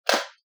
Sound effects > Objects / House appliances
The bread jumped out of the toaster.
Recorded on a Samsung Galaxy Grand Prime
toast, bread